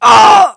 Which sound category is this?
Sound effects > Human sounds and actions